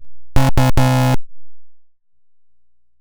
Sound effects > Electronic / Design
Optical Theremin 6 Osc dry-025
Trippy, Theremins, Dub, FX, Sci-fi, Optical, Infiltrator, Otherworldly, Robot, SFX, Noise, Spacey, Handmadeelectronic, Digital, Alien, Experimental, Bass, Glitch, DIY, Scifi, Instrument, noisey, Analog, Sweep, Robotic, Glitchy, Electronic, Synth, Theremin